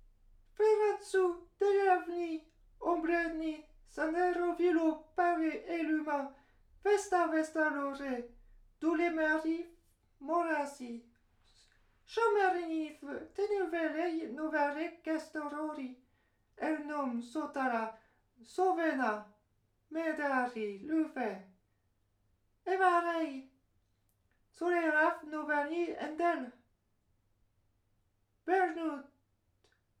Speech > Other
Mumbo Jumbo 14
FR-AV2, indoor, Mumble, mumbling, mumbo, NT5, Rode, solo-crowd, Tascam, unintelligible, XY